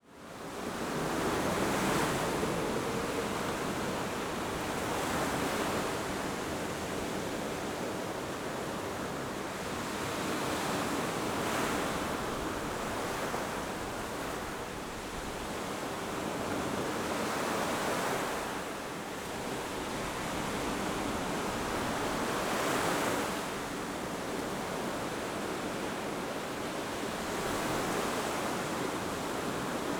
Soundscapes > Nature
Mediterranean sea waves crashing onto shore
Mediterranean sea waves crashing onto the shore.
crashing, shore, waves